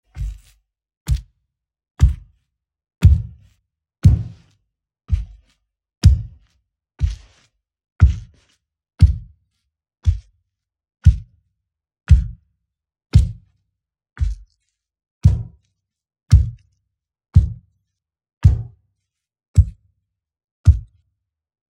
Sound effects > Human sounds and actions
Stompy Walk
steps of various intensity on a grimy floor steady and determined
footsteps, ground, walking, steps, wet, floor